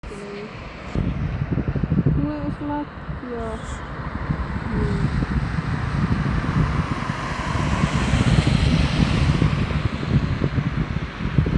Soundscapes > Urban
Car passing by, recorded with a mobilephone Samsung Galaxy S25, recorded in windy and rainy evening in Tampere suburban area. Wet asphalt with a little gravel on top and car had wintertyres
car, driving, city, tyres